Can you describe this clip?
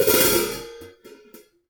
Music > Solo instrument
Vintage Custom 14 inch Hi Hat-019
Custom, Cymbal, Cymbals, Drum, Drums, Hat, Hats, HiHat, Kit, Metal, Oneshot, Perc, Percussion, Vintage